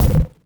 Instrument samples > Other
V-Game folley 808593 and 808485
Made by splitting the stereo of fingernails on side of matterres applying a 180°offset tremolo on each side normalising both channels. Adding a splash of reverb. Merging it to a centered Fabric side of sponge. And speeded it all up 2x. Some volume/fade adjustments. All made in Audacity.